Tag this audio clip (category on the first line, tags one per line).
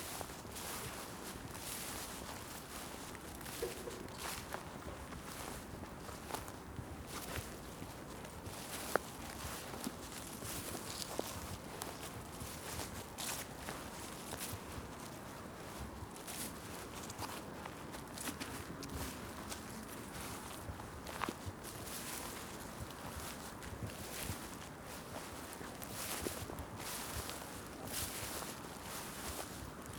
Sound effects > Human sounds and actions
foot
footsteps
grass
leaves
park
steps
walk
walking